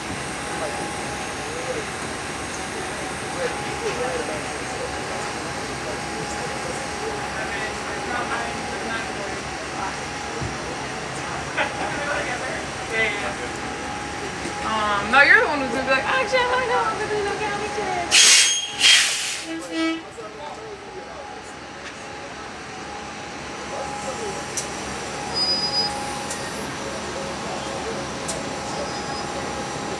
Soundscapes > Urban
Bus Station on Busy Tuesday
Recorded September 2, 2025.
talking, college, bus-stop